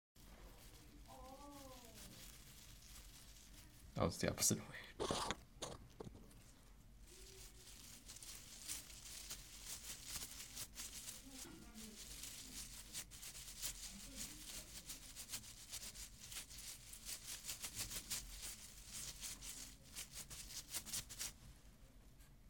Soundscapes > Nature
Leaves Rustling
Foley sound made by rubbing crumpled paper balls against one another
Leaf-rustle, bush-rustling, leaves